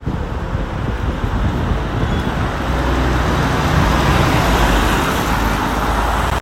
Soundscapes > Urban
Electric tram operating on metal rails. High-pitched rail friction and metallic wheel screech, combined with steady electric motor hum. Rhythmic clacking over rail joints, bell or warning tone faintly audible. Reflections of sound from surrounding buildings, creating a resonant urban atmosphere. Recorded on a city street with embedded tram tracks. Recorded on iPhone 15 in Tampere. Recorded on iPhone 15 outdoors at a tram stop on a busy urban street. Used for study project purposes.
tramway, tram, transport